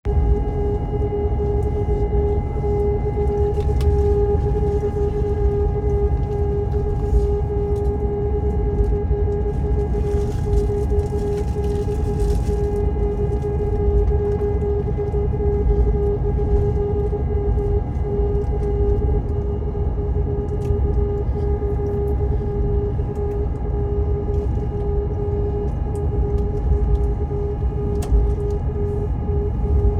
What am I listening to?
Sound effects > Other mechanisms, engines, machines
Bus noises
Coach trip sound of screeching breaks?
Bus, coach, driving, breaks, Screeching, drive